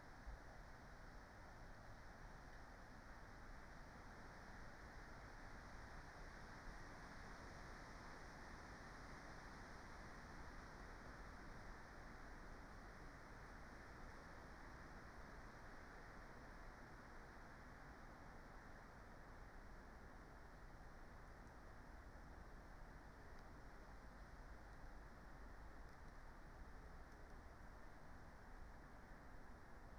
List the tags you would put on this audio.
Soundscapes > Nature
alice-holt-forest data-to-sound Dendrophone field-recording modified-soundscape natural-soundscape nature phenological-recording raspberry-pi sound-installation soundscape weather-data

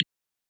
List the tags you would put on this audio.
Sound effects > Objects / House appliances
drop pipette

